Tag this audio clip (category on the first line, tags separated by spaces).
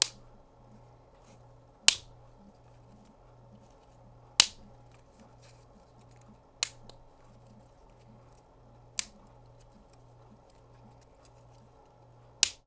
Sound effects > Objects / House appliances
foley medium Blue-brand twig snap Blue-Snowball